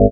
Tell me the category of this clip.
Instrument samples > Synths / Electronic